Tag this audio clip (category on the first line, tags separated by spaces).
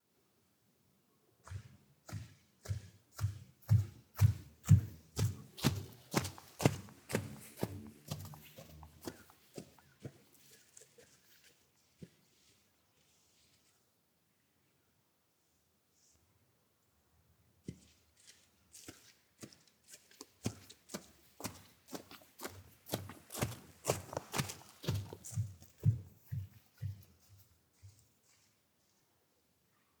Sound effects > Human sounds and actions
angry forest leaves passing-by rustle walking